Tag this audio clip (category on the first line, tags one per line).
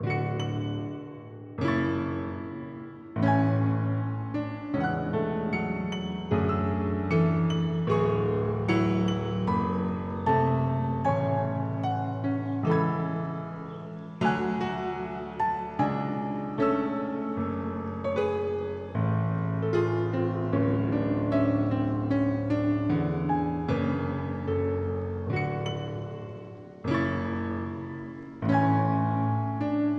Music > Solo instrument
atmosphere
Ambient
Environment
Fantasy
Chords
Chord
Somber
D
Loopable
Chill
Ambiance
Sound-Design